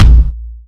Instrument samples > Percussion
fat kick 1 clicky
A clicky (overbassy) fatkick.